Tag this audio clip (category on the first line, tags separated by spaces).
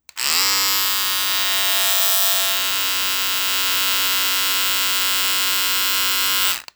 Sound effects > Objects / House appliances
glitch bathroom razor beard fx shaving electric machine sfx